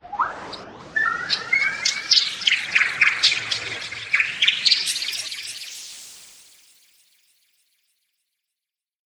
Sound effects > Experimental
689887 Tripjazz Modified
bird, Dare2025-05, edited, experimental, fx, processed